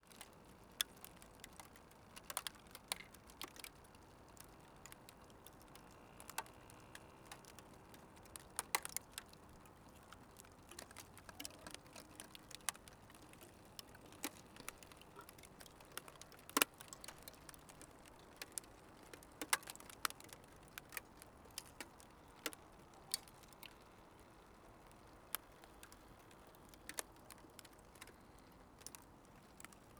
Soundscapes > Nature
The crackling of a frozen river. The crackling of ice.
crackling, frozen, ice, river